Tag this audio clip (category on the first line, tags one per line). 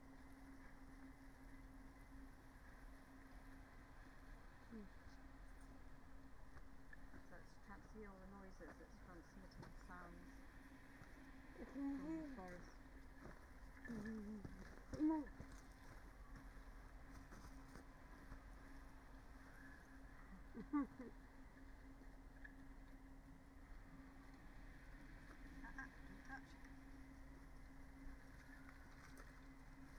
Soundscapes > Nature
alice-holt-forest
artistic-intervention
Dendrophone
field-recording
modified-soundscape
natural-soundscape
nature
raspberry-pi
sound-installation
soundscape
weather-data